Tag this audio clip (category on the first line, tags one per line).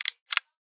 Sound effects > Human sounds and actions
click
switch
activation
toggle
button
off
interface